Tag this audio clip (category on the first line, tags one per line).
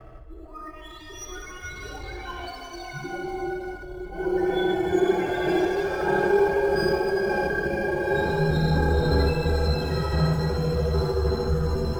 Sound effects > Electronic / Design
cinematic
content-creator
dark-design
dark-soundscapes
drowning
horror
mystery
noise
noise-ambient
PPG-Wave
science-fiction
sci-fi
scifi
sound-design
vst